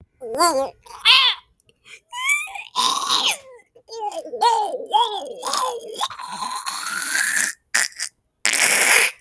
Speech > Solo speech
dude, idk im trying to make some baby vomiting or some disgusting sounds also there were no babies included in this recording
baby, vomiting